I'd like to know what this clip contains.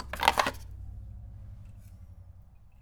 Sound effects > Other mechanisms, engines, machines
Woodshop Foley-013
sfx, tools, perc, bam, knock, little, foley, fx, oneshot, strike, rustle, percussion, metal, bang, sound, bop, tink, boom, pop, thud, shop, wood, crackle